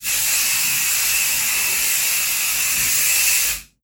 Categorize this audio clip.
Sound effects > Objects / House appliances